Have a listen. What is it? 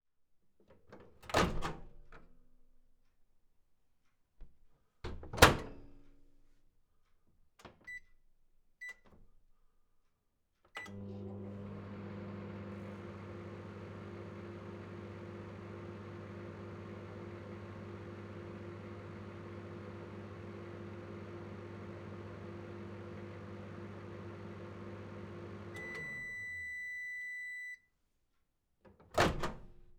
Sound effects > Objects / House appliances
DOORAppl BEEPAppl MACHAppl Microwave Oven
Operating a domestic microwave oven. Open and clos the door, set the timer, start it, wait till the timer ended the open the door once more. Recorded with a Zoom H6e and processed in Reaper.
cooking, kitchen, timer, opening, door